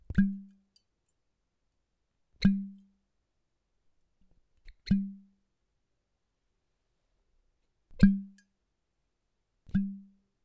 Sound effects > Objects / House appliances
Cork pulled from flask
sfx, foley, h4n